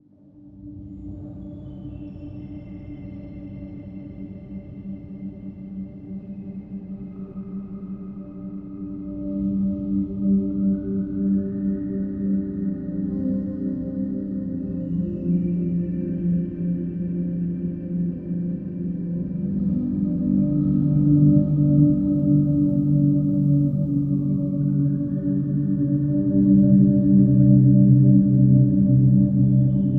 Soundscapes > Synthetic / Artificial
Relaxing Ambient Background with Far Away Voices
A granular ambient background done with a sample produced with SynthScape app on iPad and then processed with Torso S4 Ambient landscape is evolving and granular effect adds far away "voices"
ambient, granular, meditation, meditative, relaxation, relaxing, torso, torso-s4